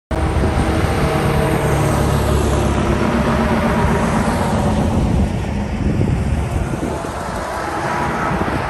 Sound effects > Vehicles
Sun Dec 21 2025 (10)
Truck passing by in highway
road, highway, truck